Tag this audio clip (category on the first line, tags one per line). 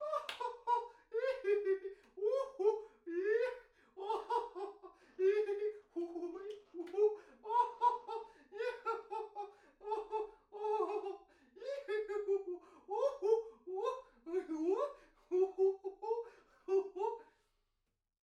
Speech > Other
unintelligible
Tascam
FR-AV2
XY
Rode
mumbo
mumbling
Mumble
solo-crowd
NT5
indoor
laughing